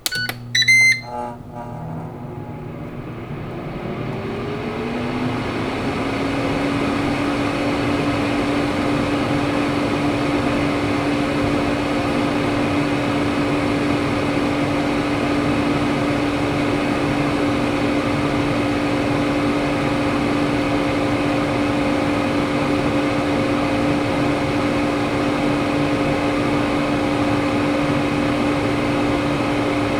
Sound effects > Objects / House appliances
Air Fryer 01
For this sound I placed a Zoom H4N multitrack recorder next to a large air fryer and turned said cooking device on.